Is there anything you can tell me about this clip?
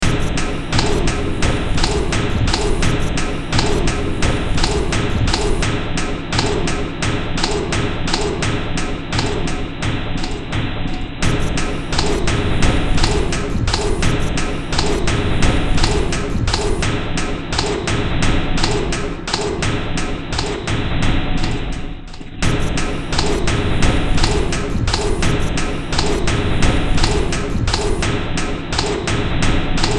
Music > Multiple instruments
Short Track #3235 (Industraumatic)
Ambient, Games, Horror, Industrial, Soundtrack, Underground